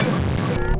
Sound effects > Electronic / Design
A glitch one-shot SX designed in Reaper with Phaseplant and various plugins.